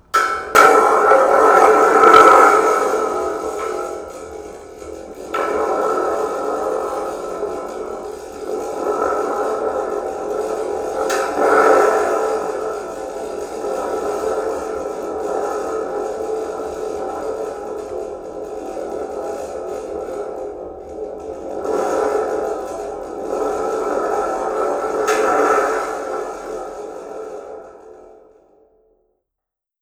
Music > Solo percussion
MUSCPerc-Blue Snowball Microphone, CU Thunder Tube, Crash, Rumbles Nicholas Judy TDC
Thunder tube crash then rumbles.